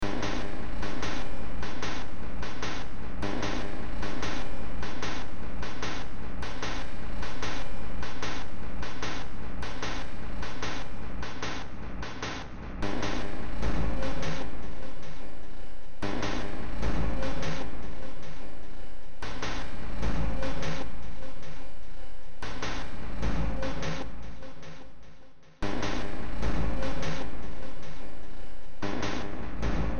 Music > Multiple instruments
Short Track #3870 (Industraumatic)
Ambient, Cyberpunk, Games, Horror, Industrial, Noise, Sci-fi, Soundtrack, Underground